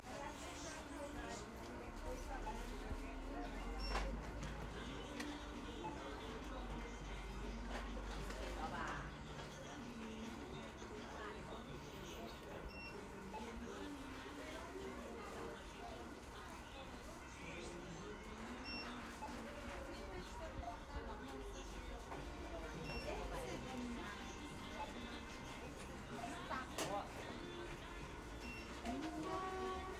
Soundscapes > Indoors
Inside Shop, Poundland, Day, London, 2OA - Spatial Audio
Recorded an ambience inside Poundland shop that sits on a busy road, day, Wood Green, London. The shop doesn't exist anymore. Recorded with Reynolds 2nd Order Ambisonics microphone, the audio file has 9 tracks, already encoded into B-Format Ambisonics. Can be encoded into binaural format.
Traffic-Outside, Free, AmbiX, Store-Ambience, Traffic, Shop, Road, Binaural, Multichannel, Bakery, O2A, Walking, Ambience, Immersive-Audio, Ambiance, 2OA, Immersive, Cars, Ambisonics, Poundland, Spatial, Chatting, Spatial-Audio, Inside, Street, Interaction, Day, Busy, Store